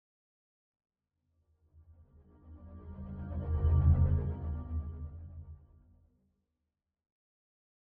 Sound effects > Experimental
Flying Car Fly By 1
Electric Eraser combined with an oscillating synth to create the sound of a futuristic car flying by
Effect, Sound, Synth